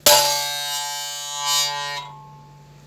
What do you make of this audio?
Sound effects > Other mechanisms, engines, machines
shot-Bafflebanging-1
Banging and hitting 1/8th inch steel baffles and plates
banging, impact, Metal